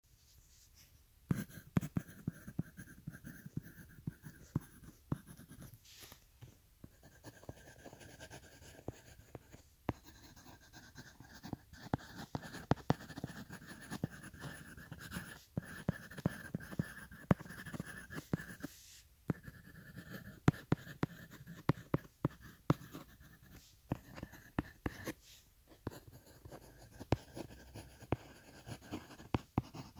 Sound effects > Objects / House appliances
Writing on paper with pencil 02
signature, write, paper, draw, writing, pencil, sign, pen, drawing, sheet, scribble